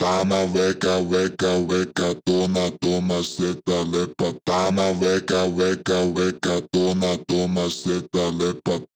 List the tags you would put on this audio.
Music > Other
Acapella Brazil BrazilFunk Vocal

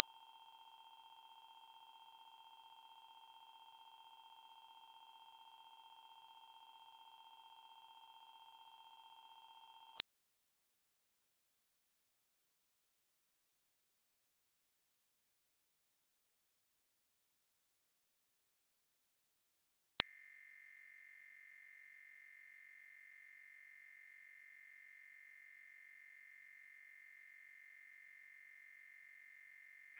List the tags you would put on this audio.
Sound effects > Experimental
experimental Orange

